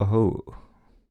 Speech > Solo speech
Flirty - oOhh
Man, voice, Male, FR-AV2, Neumann, dialogue, Single-take, sound, oneshot, singletake, Mid-20s, flirty, Video-game, NPC, Tascam, U67, Vocal, talk, Human, Voice-acting